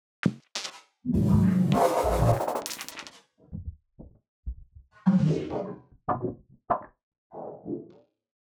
Sound effects > Electronic / Design
Optical Theremin 6 Osc Shaper Infiltrated-021
Otherworldly, FX, IDM, Mechanical, Synth, Oscillator, EDM, Crazy, Experimental, SFX, Gliltch, Electro, strange, Pulse, Analog, Robot, Noise, Robotic, Electronic, Loopable, Chaotic, Theremin, Tone, DIY, Saw, Alien, Machine, Weird, Impulse